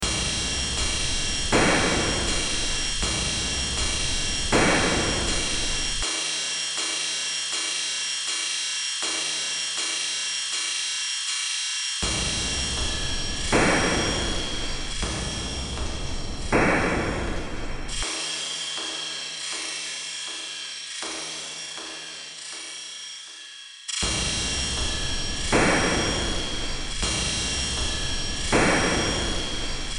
Music > Multiple instruments
Short Track #3323 (Industraumatic)
Ambient, Games, Noise